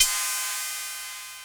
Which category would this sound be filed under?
Instrument samples > Synths / Electronic